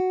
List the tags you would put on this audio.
Instrument samples > String
arpeggio
cheap
design
guitar
sound
stratocaster
tone

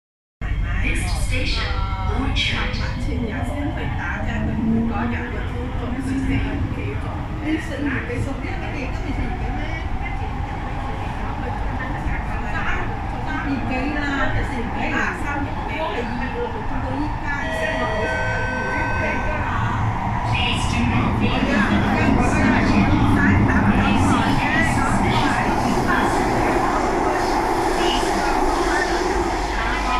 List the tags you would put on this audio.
Soundscapes > Urban
MRT
Singapore

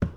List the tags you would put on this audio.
Sound effects > Objects / House appliances
bucket
carry
clang
clatter
container
debris
drop
fill
foley
lid
liquid
metal
object
pail
plastic
scoop
shake
slam
tip
tool

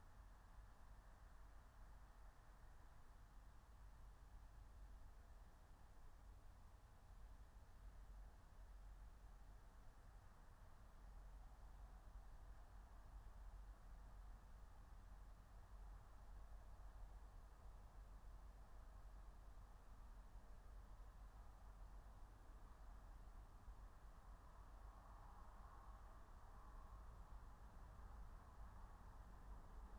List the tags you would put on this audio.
Soundscapes > Nature
alice-holt-forest
meadow
nature

